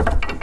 Sound effects > Other mechanisms, engines, machines
metal shop foley -010
bam, bang, boom, bop, crackle, foley, fx, knock, little, metal, oneshot, perc, percussion, pop, rustle, sfx, shop, sound, strike, thud, tink, tools, wood